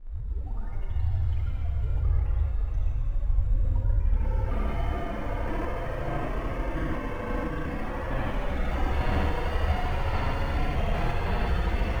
Sound effects > Electronic / Design
Murky Drowning 6
scifi, dark-techno, drowning, cinematic, noise-ambient, PPG-Wave, sound-design, dark-soundscapes, mystery, vst, sci-fi, noise, dark-design, content-creator, science-fiction, horror